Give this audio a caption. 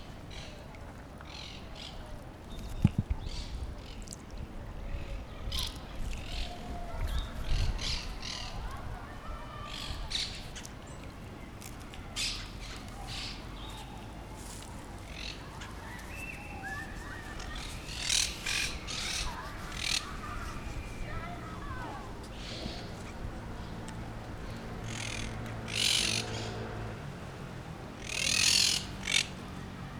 Soundscapes > Urban
Urban Ambience Recording in collab with EMAV Audiovisual School, Barcelona, November 2026. Using a Zoom H-1 Recorder.